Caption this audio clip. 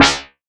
Instrument samples > Synths / Electronic
SLAPMETAL 2 Db
additive-synthesis, bass, fm-synthesis